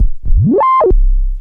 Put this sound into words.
Instrument samples > Synths / Electronic
CVLT BASS 180
bassdrop, clear, drops, low, lowend, sub, subbass, subs, synth, synthbass, wobble